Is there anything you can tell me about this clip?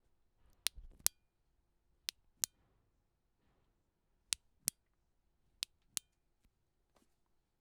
Sound effects > Other mechanisms, engines, machines
Linterna / Flashlight turning ON and OFF
No working tiny flashlight.
light, linterna